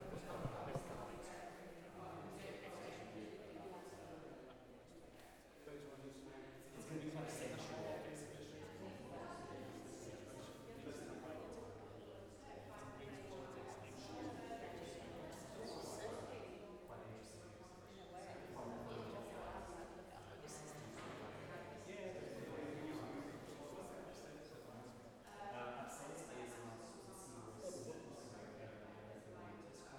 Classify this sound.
Soundscapes > Indoors